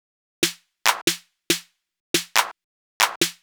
Music > Multiple instruments
Trap Clap And Grime Snare Pattern - 140bpm
A Clap and Snare loop at 140bpm. This goes with the 'Punchy Kick Pattern - 140bpm'